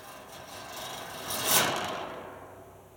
Sound effects > Objects / House appliances
Dragging a metal object across a garage door spring. Recorded with my phone.